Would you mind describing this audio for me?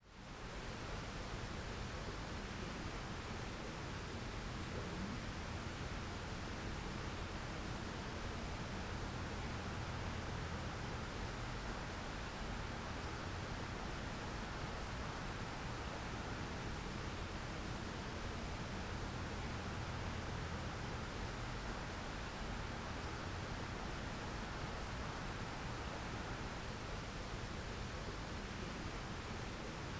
Soundscapes > Nature
Nighttime campground atmos
Nighttime ambiance recorded in the Gulpha campground, Hot Springs, AR, USA. A distant creek can be heard in the background. An Olympus LS-11 linear PCM recorder was used to record this 16-bit field audio recording.
exterior, outdoors, ambience, campground, night, atmos, creek